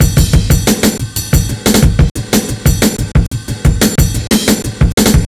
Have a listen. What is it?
Music > Other
FL studio 9 + vst slicex